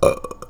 Sound effects > Human sounds and actions
HMNBurp-Blue Snowball Microphone Belch, Low Nicholas Judy TDC
A low belch.